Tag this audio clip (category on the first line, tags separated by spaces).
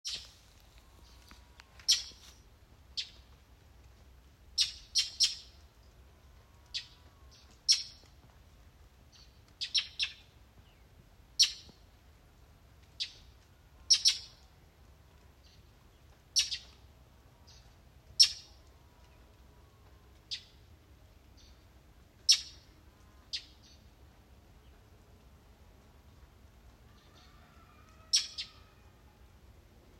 Soundscapes > Nature
nature cows farm rooster birds field-recording countryside